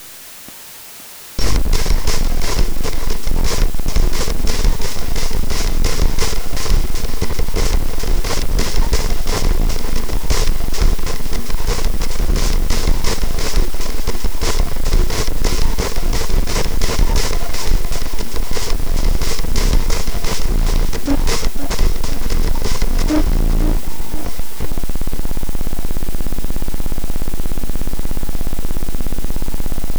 Music > Solo percussion
Simple Bass Drum and Snare Pattern with Weirdness Added 039
It's pretty much all in the name (and the tags). I took a simple 4/4 beat, snare on 1 and 3, bass on 2 and 4, and then I added erratic chains of effects that I primarily determined aleatorically. The result is sometimes noisy, sometimes it's fun or simply strange, but perhaps it could be useful to you in some way.
FX-Drum, FX-Laden-Simple-Drum-Pattern, Experimental-Production, FX-Laden, Fun, Bass-Drum, Silly, Experiments-on-Drum-Patterns, Experimental, Noisy, Four-Over-Four-Pattern, Experiments-on-Drum-Beats, Bass-and-Snare, Simple-Drum-Pattern, Glitchy, FX-Drums, Snare-Drum, Interesting-Results, FX-Drum-Pattern